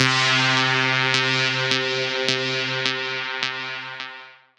Instrument samples > Synths / Electronic
CVLT BASS 143
bass, bassdrop, clear, drops, lfo, low, lowend, stabs, sub, subbass, subs, subwoofer, synth, synthbass, wavetable, wobble